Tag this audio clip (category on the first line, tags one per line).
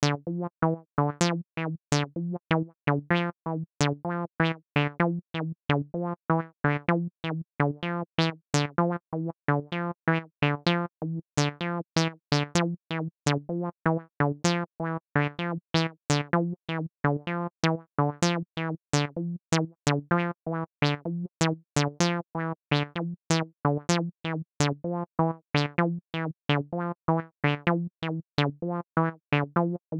Music > Solo instrument
electronic
synth
house
techno
303
hardware
TB-03
Roland
Recording
Acid